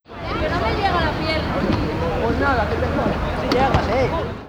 Soundscapes > Urban
071 PEDROINESBRIDGE TOURISTS WATER-PLAY 1
play, tourists, water, talking